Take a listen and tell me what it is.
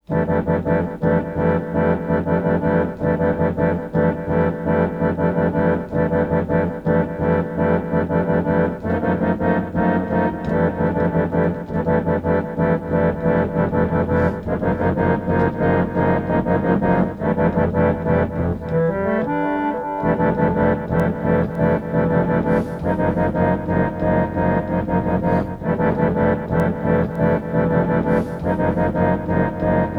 Music > Solo instrument
pump-organ riff or musical sketch with contemporary feeling